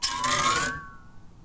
Sound effects > Other mechanisms, engines, machines
Handsaw Pitched Tone Twang Metal Foley 31
foley, fx, handsaw, hit, household, metal, metallic, perc, percussion, plank, saw, sfx, shop, smack, tool, twang, twangy, vibe, vibration